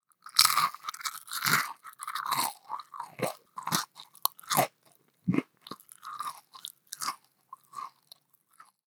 Sound effects > Other
FOODEat Cinematis RandomFoleyVol2 CrunchyBites CrackerBite OpenMouth SlowChew Freebie
bag, bite, bites, cracker, crunch, crunchy, design, effects, foley, food, handling, plastic, postproduction, recording, rustle, SFX, snack, sound, texture